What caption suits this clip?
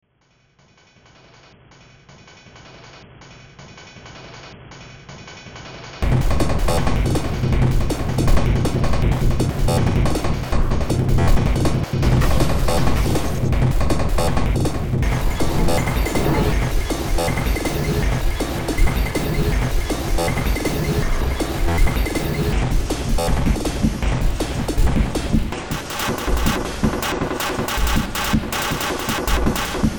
Music > Multiple instruments
Demo Track #2974 (Industraumatic)
Industrial, Cyberpunk, Noise, Games, Ambient, Horror, Underground, Soundtrack, Sci-fi